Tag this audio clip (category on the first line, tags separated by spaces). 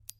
Sound effects > Experimental
bones foley onion punch thud vegetable